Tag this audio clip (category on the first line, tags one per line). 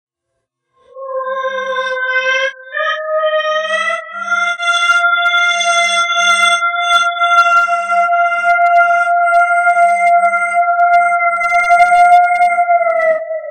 Speech > Processed / Synthetic
abstract
alien
animal
atmosphere
dark
effect
fx
glitch
glitchy
growl
howl
monster
otherworldly
pitch
processed
reverb
sfx
shout
sound-design
sounddesign
spooky
strange
vocal
vocals
vox
weird
wtf